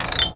Music > Solo percussion
MUSCTnprc-Blue Snowball Microphone, CU Xylophone, Thai, Teakwood, Gliss Down Nicholas Judy TDC
A teakwood thai xylophone gliss down.
Blue-Snowball, gliss, teakwood, xylophone